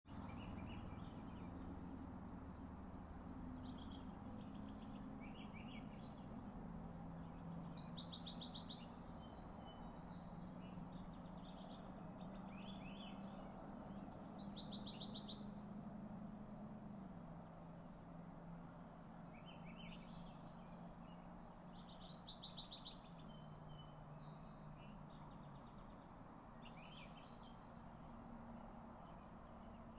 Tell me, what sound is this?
Soundscapes > Nature
Early morning birds 2
Early morning birds singing peacefully before the sun comes up! Sound was recorded on a iPhone14 pro max with a TX wireless mic.
Birds, Birdsong, Morning, Nature, Peaceful